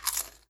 Sound effects > Human sounds and actions
FOODEat-Samsung Galaxy Smartphone Bite into Potato Chip Nicholas Judy TDC
Someone biting into a potato chip.